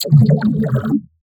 Sound effects > Electronic / Design
ROS-FX One Shoot 4
Sample used from a drum loop in Flstudio original sample pack. Processed with KHS Filter Table, Vocodex, ZL EQ and Fruity Limiter.